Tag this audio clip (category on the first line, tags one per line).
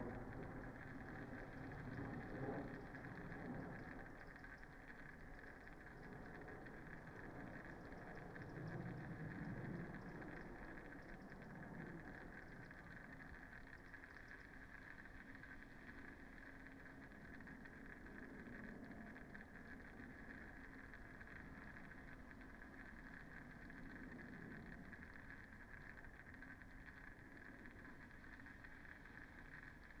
Soundscapes > Nature
data-to-sound
Dendrophone
field-recording
modified-soundscape
nature
phenological-recording
sound-installation
soundscape
weather-data